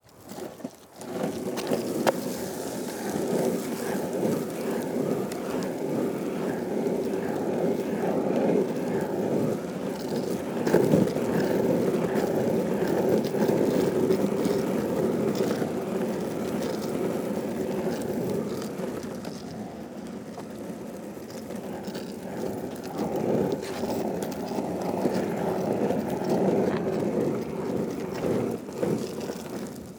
Sound effects > Human sounds and actions
skate board rolling
Skate board on raod, close up.